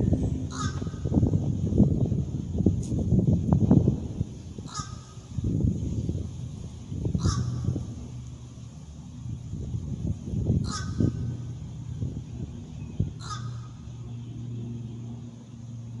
Sound effects > Animals
fish crow field recording bird caw
Strange bird noise from the Fish Crow.
bird, caw, birdsong